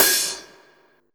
Instrument samples > Percussion
HR V10 HiHat open
cymbals, digital, drum, drums, Hihat, machine, one-shot, physical-modelling, sample, stereo